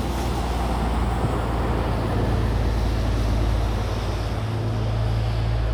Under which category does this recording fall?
Sound effects > Vehicles